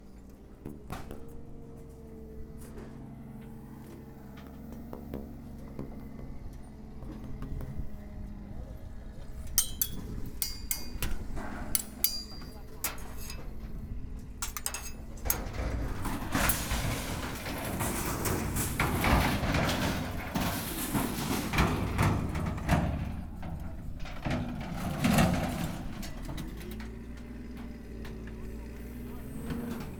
Sound effects > Objects / House appliances
Junkyard Foley and FX Percs (Metal, Clanks, Scrapes, Bangs, Scrap, and Machines) 206
Bash, dumpster, Robotic, waste